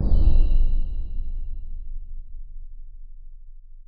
Sound effects > Electronic / Design
006 LOW IMPACT
BACKGROUND, BASSY, BOOMY, DEEP, HIT, HITS, IMPACT, IMPACTS, LOW, PUNCH, RATTLING, RUMBLE, RUMBLING